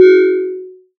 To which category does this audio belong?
Instrument samples > Synths / Electronic